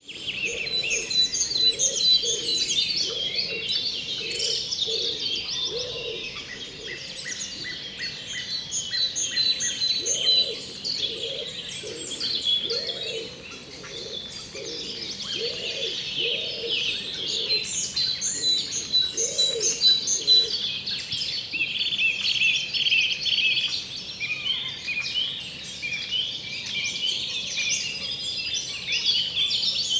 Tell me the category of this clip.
Soundscapes > Nature